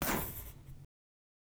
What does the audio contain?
Sound effects > Objects / House appliances
Pencil scribbles/draws/writes/strokes in a sharp movement.